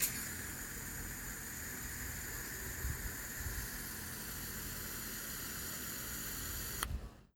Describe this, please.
Sound effects > Objects / House appliances
WATRSpray-Samsung Galaxy Smartphone, MCU Water Hose, Shower Mode Nicholas Judy TDC
A water hose spraying in shower mode.
hose, Phone-recording